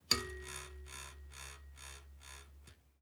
Sound effects > Other mechanisms, engines, machines
Heavy Spring 01
garage, noise, sample, spring